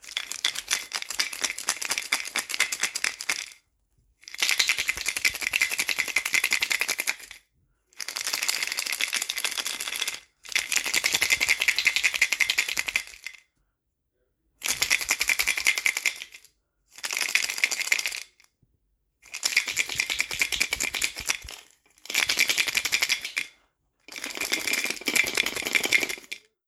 Sound effects > Objects / House appliances
TOONShake-Samsung Galaxy Smartphone, CU Pill Bottle 02 Nicholas Judy TDC
A pill bottle shaking.
bottle, cartoon, Phone-recording, pill